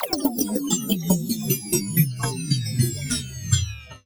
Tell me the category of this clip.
Sound effects > Electronic / Design